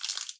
Instrument samples > Percussion
Cellotape Percussion One Shot10
percussion, organic, sounds, layering, drum, glitch, one, found, foley, cellotape, design, electronic, pack, unique, cinematic, experimental, creative, adhesive, DIY, texture, sound, samples, tape, IDM, ambient, lo-fi, shot, shots, sample